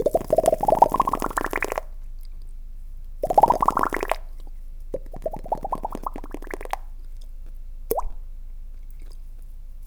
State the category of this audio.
Sound effects > Objects / House appliances